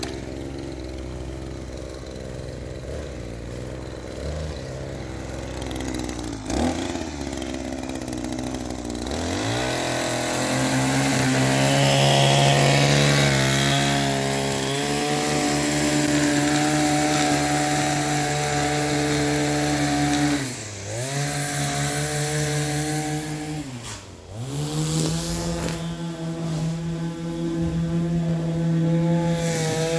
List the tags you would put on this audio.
Sound effects > Other mechanisms, engines, machines
Phone-recording start stop run weed-eater

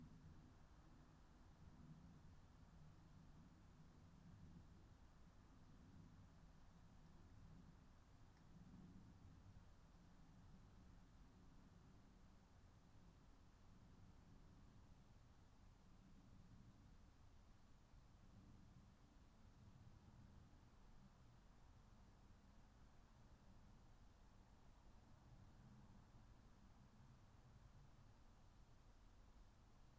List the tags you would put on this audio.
Soundscapes > Nature
phenological-recording weather-data soundscape natural-soundscape nature alice-holt-forest modified-soundscape data-to-sound

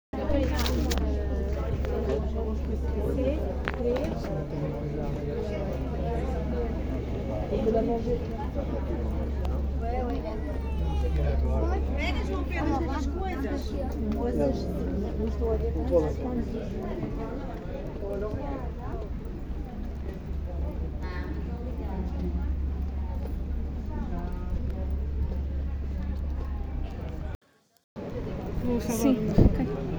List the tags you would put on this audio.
Soundscapes > Urban
atmophere recording

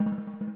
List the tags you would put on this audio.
Music > Solo percussion
realdrums flam reverb perc drum

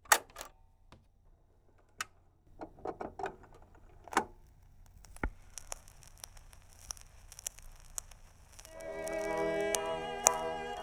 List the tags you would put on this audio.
Instrument samples > Other
record scratching vinyl

